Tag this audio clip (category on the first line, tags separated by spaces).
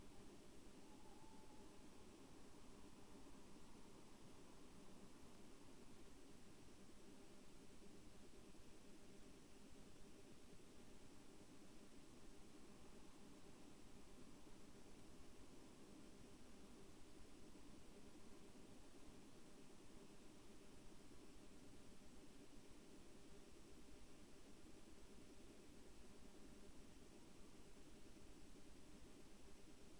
Soundscapes > Nature
artistic-intervention data-to-sound field-recording modified-soundscape raspberry-pi weather-data